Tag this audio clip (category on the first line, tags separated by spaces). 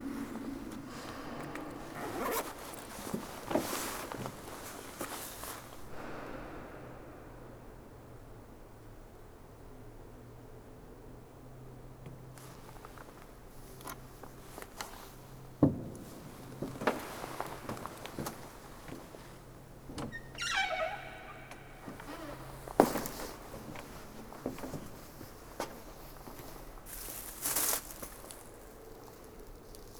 Soundscapes > Indoors
quiet slap squeak walking